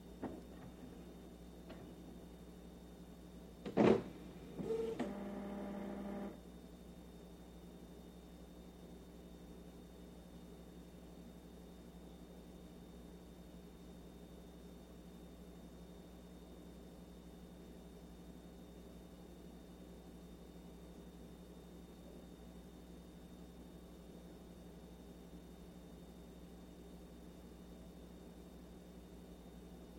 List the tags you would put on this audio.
Sound effects > Objects / House appliances
ASMR
Canon
Canon-Pixma
computer
ink-jet
Inkjet
mechanical
nk-jet
nostalgia
office
Pixma
printer
printing
robot
technology
work

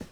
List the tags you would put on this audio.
Sound effects > Objects / House appliances
shake
spill

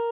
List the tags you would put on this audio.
Instrument samples > String
arpeggio
tone
sound
guitar
cheap
stratocaster
design

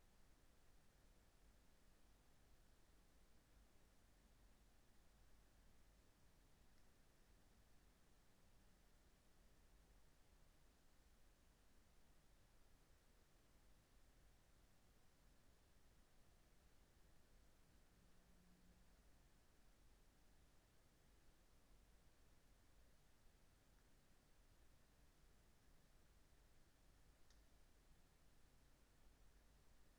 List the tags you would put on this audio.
Soundscapes > Nature
field-recording
modified-soundscape
natural-soundscape
data-to-sound
weather-data
soundscape
sound-installation
Dendrophone
nature
phenological-recording
alice-holt-forest
raspberry-pi
artistic-intervention

